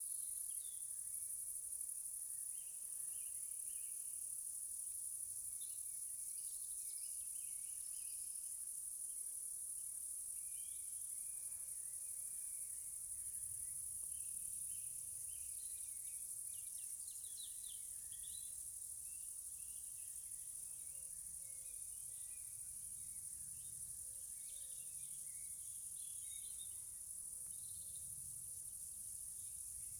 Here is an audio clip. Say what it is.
Soundscapes > Nature
orthoptères Field 2
Orthoptera - crickets, cicadas, grillons... - singing in a glade, 8PM, june 2025, Bourgogne. Birds in the background.
birds, bourgogne, cicadas, crickets, field-recording, insects, nature, Orthoptera, summer